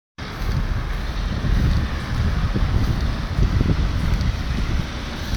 Soundscapes > Urban
Car with studded tires recorded on phone
Car, passing, studded, tires